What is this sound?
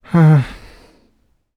Speech > Solo speech
Sadness - Uhmff
dialogue, FR-AV2, Human, Male, Man, Mid-20s, Neumann, NPC, oneshot, sad, Sadness, singletake, Single-take, sound, talk, Tascam, U67, Video-game, Vocal, voice, Voice-acting